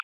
Instrument samples > Percussion
Organic-Water Snap 7.1
Organic, EDM, Glitch, Snap, Botanical